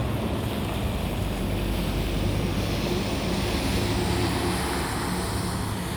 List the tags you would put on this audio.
Sound effects > Vehicles
engine
vehicle
bus

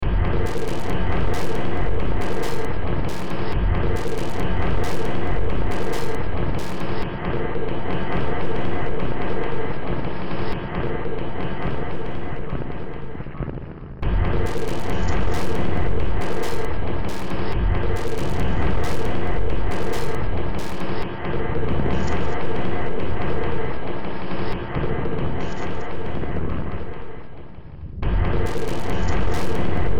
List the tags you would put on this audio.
Music > Multiple instruments
Underground
Ambient
Industrial
Soundtrack
Sci-fi

